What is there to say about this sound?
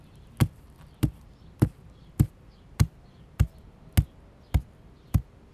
Sound effects > Natural elements and explosions
Smashing biscuits on the floor with a rock - MS RAW
Subject : Me smashing some biscuits on the floor. I wanted to record birds and thought it be a way to get the close to the mic. I didn't really use the bird recording, but I got this at least. Date YMD : 2025 04 01 Location : Saint-Assiscle France Hardware : Zoom H2N MS raw Weather : Processing : Trimmed and Normalized in Audacity.
buiscuits, crushing, H2N, MS, MS-RAW, Pounding, rock, smash, smashing, stone, Zoom